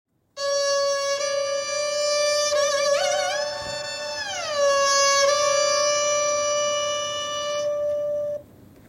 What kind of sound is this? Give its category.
Instrument samples > String